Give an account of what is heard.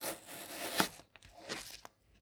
Sound effects > Other
Long slice vegetable 12
Chef, Cook, Cooking, Indoor, Home, Kitchen, Knife, Vegetable, Chief, Slice, Cut